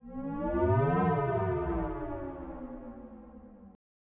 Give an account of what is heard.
Sound effects > Electronic / Design
NOISE SWEEP RISE
From a collection of whooshes made from either my Metal Marshmallow Pro Contact Mic, Yamaha Dx7, Arturia V Collection
gaussian, air, flyby, synth, swoosh, jet, whoosh, Sound, ui, whip, pass-by, transition